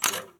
Sound effects > Other mechanisms, engines, machines
Releasing the engine stop lever on a push mower. Recorded with my phone.
click, latch, lever, press, squeak, switch